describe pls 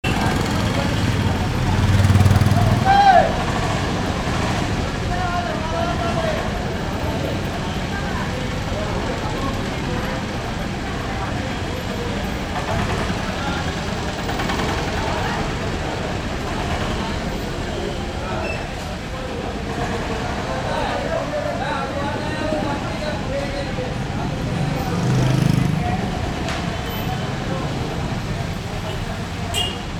Soundscapes > Urban
Loud India (Arati) Uttaranchal India Rishikesh Gita Bhavan Ashram
Sound recorded in India where I explore the loudness produced by human activity, machines and environments in relation with society, religion and traditional culture.